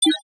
Sound effects > Electronic / Design
random UI sound
This sound was made and processed in DAW using only my synths/samples; - Clicky sound for maybe UI input or button press or an error. Basically use it for anything. I made it with... I forgot already, i think it was a lot of phaser processing and harmonic shift of a waveform. - Credits are unnecessary but welcomed. - Ы.
application, button-sound, click, computer, game, input, input-reaction, interface, key, press, pressing-sound, UI, user-interface